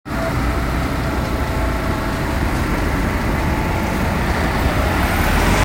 Soundscapes > Urban
Bus leaving 21
Where: Hervanta keskus What: Sound of a bus leaving bus stop Where: At a bus stop in the evening in a calm weather Method: Iphone 15 pro max voice recorder Purpose: Binary classification of sounds in an audio clip
bus, bus-stop, traffic